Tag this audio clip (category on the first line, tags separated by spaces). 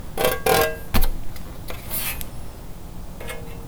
Sound effects > Other mechanisms, engines, machines
perc plank vibe sfx foley smack shop household fx saw handsaw tool twang hit metallic vibration metal twangy percussion